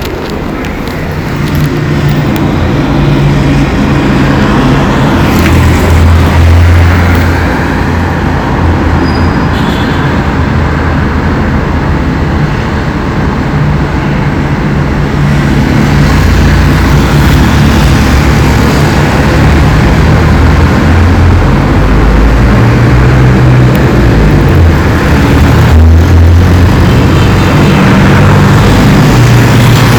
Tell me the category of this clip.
Sound effects > Other mechanisms, engines, machines